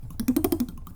Sound effects > Objects / House appliances
knife and metal beam vibrations clicks dings and sfx-105
Clang, Foley, SFX, ding, Trippy